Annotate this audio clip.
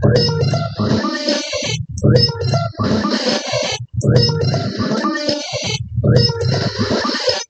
Sound effects > Electronic / Design
Stirring The Rhythms 16
PPG-Wave, noise, noise-ambient, scifi